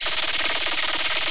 Sound effects > Electronic / Design
laser gun 2
Laser gun sound designed for a sci-fi videogame. It sounds like trrrrrrrrrrrrrrrrrrrrrrrrrr.
electronic, gun, laser, lasergun, sci-fi, sfx, shooting, videogame, videogames, weapon